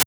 Instrument samples > Synths / Electronic
A databent closed hihat sound, altered using Notepad++